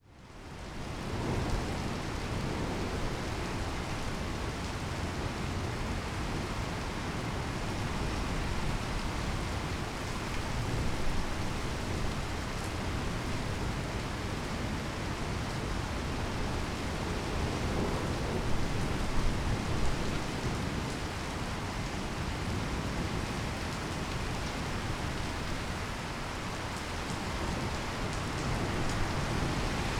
Soundscapes > Nature
250503 160319 FR Thunderstorm over suburbs
Spring thunderstorm passing over the suburbs. I made this recording from my balcony, located in Nanterre (suburbs of Paris, France) during a relatively hot afternoon of may 2025. One can here rain (quite heavy at times) falling on the leaves of the trees, on the concrete of the street, and on the metallic fence of the balcony, while a massive thunder storm was passing over the suburbs on the east of my position, with thunder rolling almost continuously. From time to time, one can also hear some vehicles passing by in the wet street. At the end of the file, the rain stops gradually, and the storm fades away. Recorded in May 2025 with a Zoom H5studio (built-in XY microphones). Fade in/out applied in Audacity.
street, town, thunder, rainstorm, weather, raining, field-recording, lightning, city, Nanterre, France, wet, thunderbolt, thunder-roll, atmosphere, vehicles, thunderclap, suburb, ambience, rainy, suburban, thunder-strike, rain, thunderstorm, soundscape